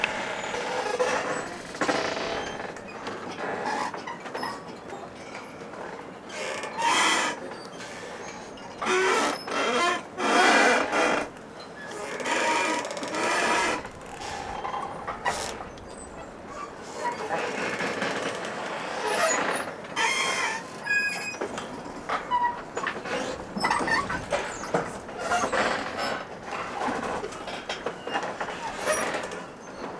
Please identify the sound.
Sound effects > Other
the creaking pier in the harbor during a sea storm. Olympus LS10 + Primo Łeba, Poland
creak, field-recording, sea